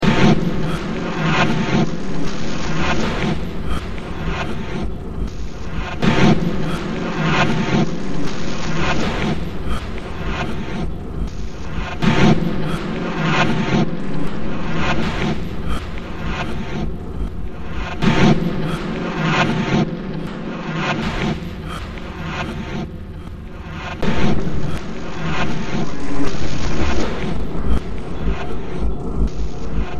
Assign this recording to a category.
Music > Multiple instruments